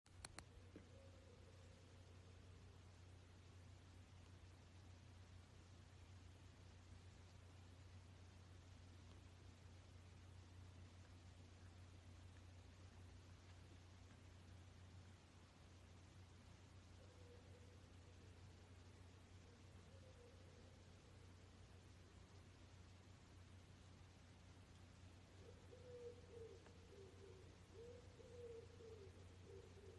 Soundscapes > Nature
Two forest birds intercommunicate Dalstorp part 2
Two forest birds intercommunicate from a distance close to a motorway on a hot humid summer afternoon just outside Dalstorp Sweden. Original field-recording.
field-recording, summer, Scandinavia, dove, log-cabin, birds, spring, nature, Sweden, wilderness, backwoods, jungle, woodlands, afternoon, forest